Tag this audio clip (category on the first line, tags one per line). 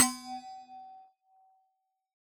Sound effects > Objects / House appliances
sampling
percusive
recording